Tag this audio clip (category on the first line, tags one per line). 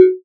Instrument samples > Synths / Electronic
bass
fm-synthesis